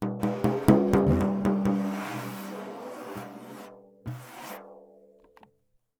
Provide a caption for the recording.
Music > Solo instrument
Tom Tension Pitch Change Tap Perc-003
Crash, Custom, Cymbal, Cymbals, Drum, Drums, FX, GONG, Hat, Kit, Metal, Oneshot, Paiste, Perc, Percussion, Ride, Sabian